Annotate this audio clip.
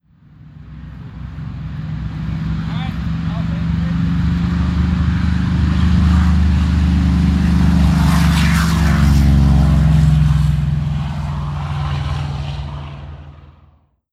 Sound effects > Vehicles
A Corsair passing by. Recorded at the Military Aviation Museum at Virginia Beach in Summer 2021.